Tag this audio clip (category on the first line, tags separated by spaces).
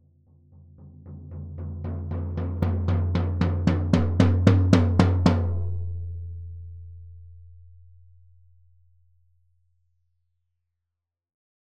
Music > Solo percussion
acoustic beat beatloop beats drum drumkit drums fill flam floortom instrument kit oneshot perc percs percussion rim rimshot roll studio tom tomdrum toms velocity